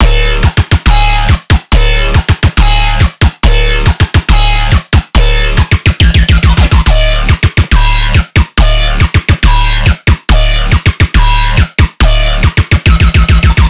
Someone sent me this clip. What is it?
Music > Solo instrument
LOUD. heavy dubstep bass loop made with ableton operator. 140bpm. key of D.